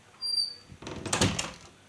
Sound effects > Objects / House appliances
Door closing. Recorded with my phone.
closing, shut, close, door